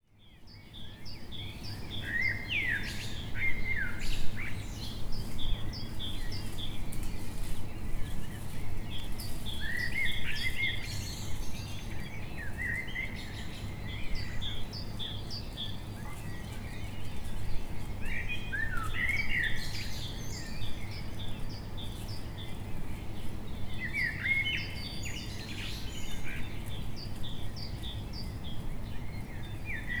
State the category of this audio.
Soundscapes > Nature